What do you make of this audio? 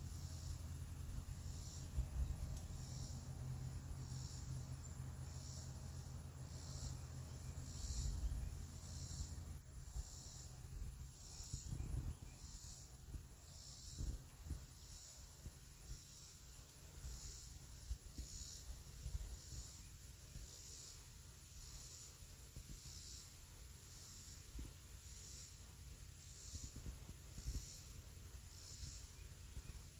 Soundscapes > Nature
Ambience at a hot summer day, wind, crickets and birds.